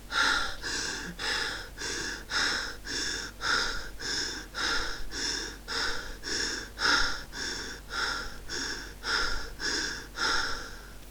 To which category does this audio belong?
Speech > Other